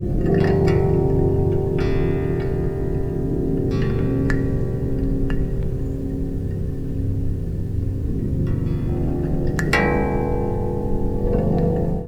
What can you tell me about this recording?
Soundscapes > Nature
Text-AeoBert-Pad-pings-11
Here are a few edits from a long recording (12 hour) of storm Bert November 2024 here in central Scotland. The sounds are both the wind swelling on the harp in addition to the rain hitting the strings of my DIY electric aeolian harp. THis is a selection of short edits that reflect the more interesting audio moments captured.
aeolian, swells, storm, moody